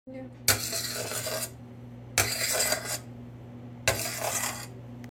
Sound effects > Other
Metal on Metal clink and grinding.
I created this sound for an animation project in GTA where I needed the effect of a motorcycle grinding on a rail. I had to piece it together myself using what I had available — the sound was made using tongs on a kitchen sink and recorded with a smartphone. The quality isn’t perfect, but it got the job done, and I thought it might be useful for someone else out there working on a similar project. Happy creating, everyone!
metal, clink, grind